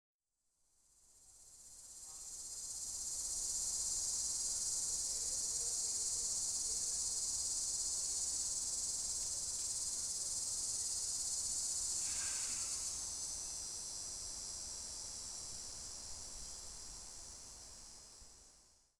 Soundscapes > Nature
Cicacas W Power Tool
Field Recording of a Cicada in a Suburb
Bug, Cicada, Day, Natural, Nature, Recording, Summer